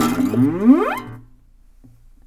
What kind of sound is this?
Music > Solo instrument
acoustic guitar slide21
pretty, solo, slap, instrument, guitar, chords, string, dissonant, knock, strings, riff